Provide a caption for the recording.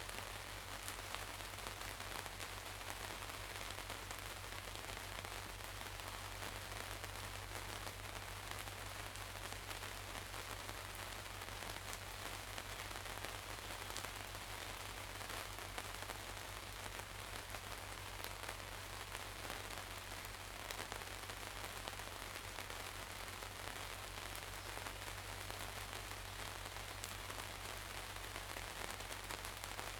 Soundscapes > Nature
24h ambiance pt-07 - 2025 04 16 09h00 - 12H00 Gergueil Greenhouse
country-side, France, Morning, rain, raining, rain-shower, Rural